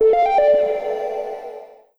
Sound effects > Electronic / Design
XD olfo
A welcome lil ringtone/chime, made on a Korg Minilogue XD, processed in Pro Tools. A quick lil motif, with added spacey reverb.
bleep, electronic, beep, effect, ui, gui, sfx, Korg, game, digital, chirp, blip, Minilogue-XD, synth, computer, click, processed, ringtone